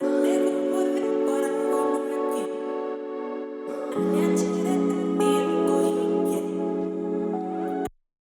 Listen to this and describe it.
Music > Multiple instruments
RUSOWSKI SYNTH
cool funky synth, part of a whole beat AI generated: (Suno v4) with the following prompt: generate a funky and fun instrumental inspired in the spanish artist rusowski or something similar, with vocal chops and a nice bass, in F# minor, at 90 bpm.